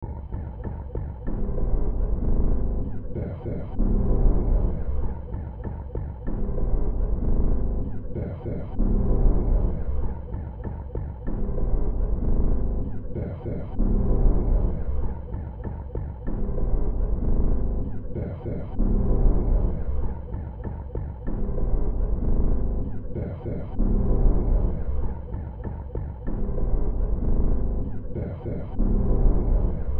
Soundscapes > Synthetic / Artificial
Looppelganger #154 | Dark Ambient Sound
Ambience, Ambient, Darkness, Drone, Games, Gothic, Horror, Noise, Sci-fi, Silent, Soundtrack, Survival, Underground, Weird